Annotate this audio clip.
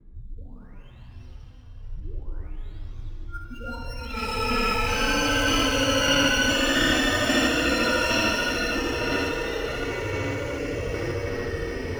Sound effects > Electronic / Design
Murky Drowning 13
content-creator, science-fiction, PPG-Wave, vst, dark-design, noise-ambient, sound-design, noise, dark-techno, sci-fi, dark-soundscapes, horror, drowning, cinematic, mystery, scifi